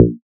Instrument samples > Synths / Electronic
DUCKPLUCK 4 Bb
additive-synthesis, bass, fm-synthesis